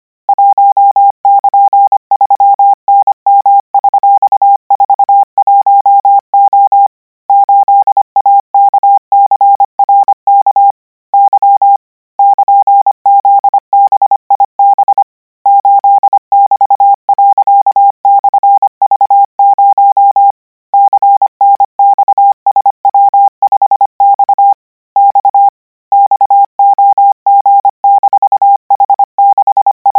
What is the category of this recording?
Sound effects > Electronic / Design